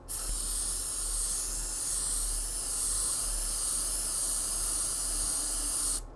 Sound effects > Objects / House appliances
AIRHiss-Blue Snowball Microphone, CU Can, Spray Nicholas Judy TDC
A spray can spraying.
Blue-Snowball, hiss, can, air, Blue-brand, spray